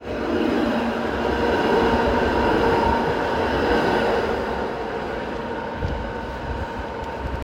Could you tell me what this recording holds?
Soundscapes > Urban
Tram passing Recording 34
Tram, Rail, Train